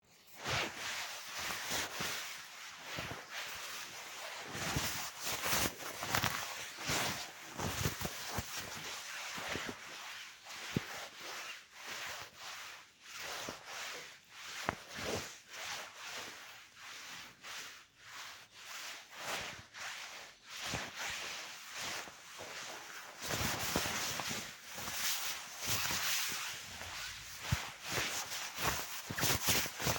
Sound effects > Other
Jacket Clothing movements
Sounds of clothes rustling, some walking movements
cloth, fabric, foley, jacket, rustle, walking